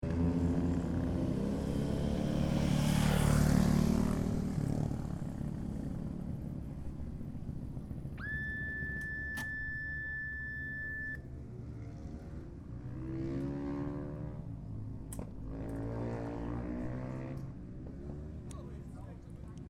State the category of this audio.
Soundscapes > Other